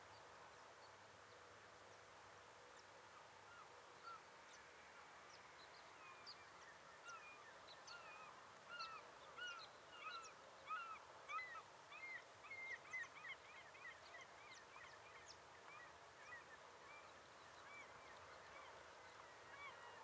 Soundscapes > Nature
Birds on the coast 1
birds, gull, field-recording, bird, waves, coast, nature